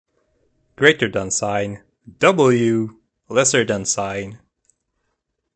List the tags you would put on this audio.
Speech > Solo speech
furry male meme

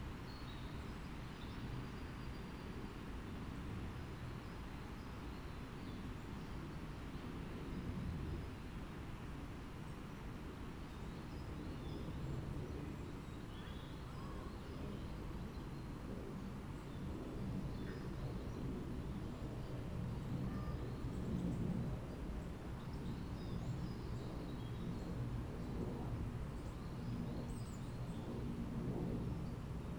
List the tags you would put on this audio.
Soundscapes > Nature
data-to-sound alice-holt-forest soundscape sound-installation field-recording weather-data natural-soundscape phenological-recording artistic-intervention raspberry-pi nature Dendrophone modified-soundscape

